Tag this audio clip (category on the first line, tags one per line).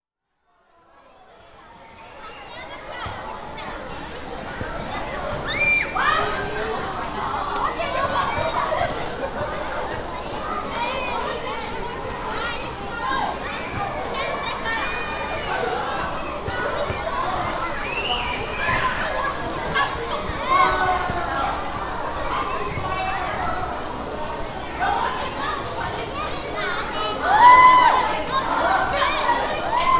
Soundscapes > Urban
children
playground
kidsplaying
schoolyard